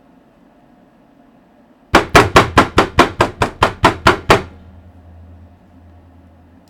Sound effects > Objects / House appliances
desk-bang
I bang my desk a few times. you can subtly hear the spring on my micro-arm a little bit. recorded on a M-audio uber mic
bang, desk, slam, smack